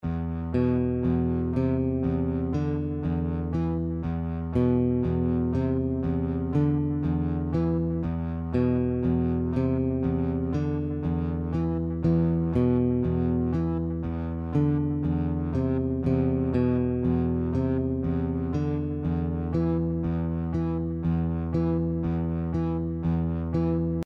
Music > Other
Background music cutscene
A short loop I made for cutscenes in a small video game. The song is made in Cubase with 1 helion vst synth and 1 ABPL2 (bass) at 120bpm. The track is meant to be simple with a feeling of old video games.
Instrumental, Slow, Synth